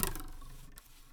Sound effects > Other mechanisms, engines, machines
metal shop foley -127
bam, bang, boom, bop, crackle, foley, fx, knock, little, metal, oneshot, perc, percussion, pop, rustle, sfx, shop, sound, strike, thud, tink, tools, wood